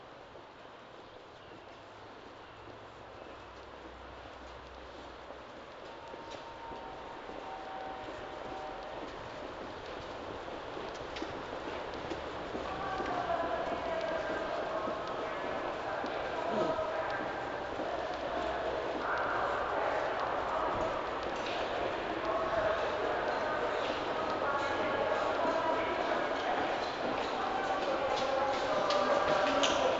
Soundscapes > Urban
Old recording, made probably with phone, during my 2015 January work commute. Part 4: Gdynia Railway Station You can hear echoed footsteps, trains and PA's sounds.
hall, railway